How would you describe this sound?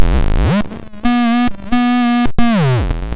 Sound effects > Electronic / Design
Optical Theremin 6 Osc dry-010
Sounds from an Optical Theremin i built using a 74C14 HEX Schmidtt inverter. There are 6 oscillators built from photoresistors, joysticks from old PS2 controllers, and various capacitors and pots. The light source used in these recordings was ambient and direct sunlight coming from the skylights in my music studio. Further processing with infiltrator, shaperbox, and various other vsts was also implemented on some of the sounds in this pack. Final batch processing was done in Reaper
Noise, Robot, Digital, Theremins, Robotic, FX, Optical, Experimental, Electronic, Trippy, SFX, Glitch, Analog, Bass, Glitchy, noisey, DIY, Sweep, Alien, Scifi, Theremin, Electro, Sci-fi, Dub, Handmadeelectronic, Instrument, Synth, Spacey, Infiltrator, Otherworldly